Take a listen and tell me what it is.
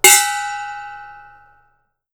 Sound effects > Objects / House appliances
METLImpt-Blue Snowball Microphone Metal, Clang, Thin 01 Nicholas Judy TDC
A thin metal clang.
Blue-brand, clang, metal, thin